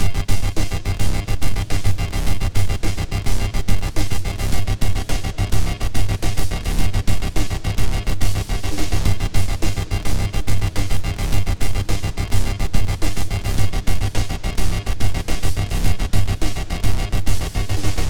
Music > Multiple instruments
roasted machine beat
Pitch-shifted distorted tone generator with fill-heavy hip hop beat. Features heavily modified samples from PreSonus loop pack included in Studio One 6 Artist Edition